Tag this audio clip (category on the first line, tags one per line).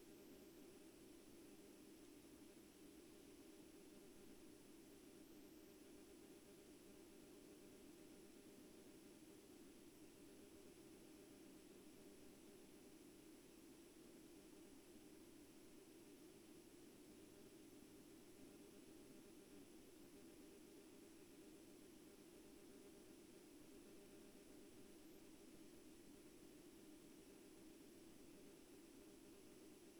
Soundscapes > Nature
soundscape
raspberry-pi
nature
field-recording
modified-soundscape
phenological-recording
alice-holt-forest
weather-data
artistic-intervention
natural-soundscape
data-to-sound
Dendrophone
sound-installation